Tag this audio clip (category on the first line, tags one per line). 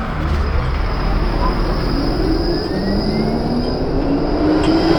Sound effects > Vehicles
vehicle
tramway
transportation